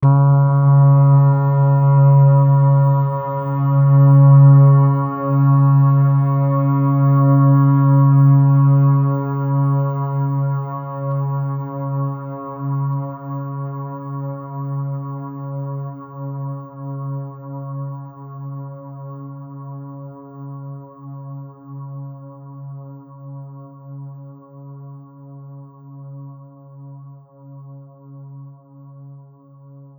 Instrument samples > Synths / Electronic
Synth Ambient Pad #011 created with Soma Terra
Synth ambient pad created with Soma Terra
synth, ambient, one-shot, soma-terra, space-pad, space, pad, cinematic